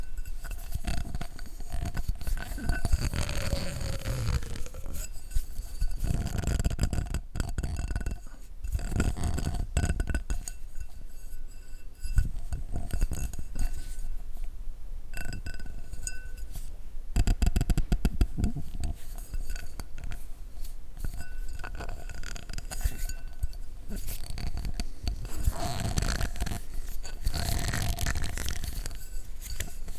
Sound effects > Objects / House appliances
Squeezing-glass-with-fingers
A stereo recording of squeezing an empty glass with my fingers that I've made in order to create some surface/texture sound with a bit of tension for a horror short I'm making. Recorded with Zoom H5.
fingers, squeeze, surface